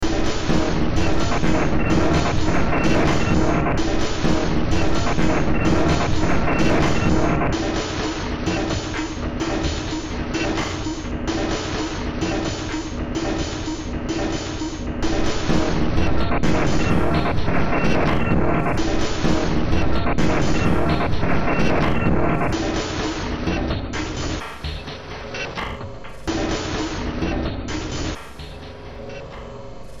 Music > Multiple instruments
Demo Track #3645 (Industraumatic)
Ambient, Cyberpunk, Games, Horror, Industrial, Noise, Sci-fi, Soundtrack, Underground